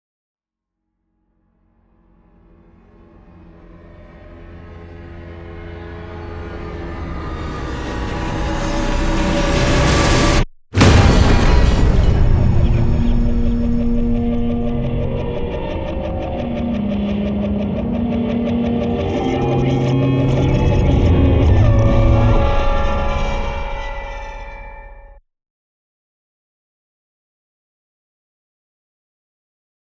Sound effects > Other
Dark Trailer Impact Sound Effect.
ambient, atmosphere, cinematic, creepy, dark, effect, fear, horror, impact, jumpscare, movie, scary, sfx, sound, suspense, tension, thriller, trailer